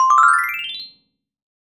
Sound effects > Electronic / Design
glissando Glockenspiel
Program : FL Studio Purity
cartoon, cartoon-sound, fx, glissando, Glockenspiel, sfx, sound, sound-effect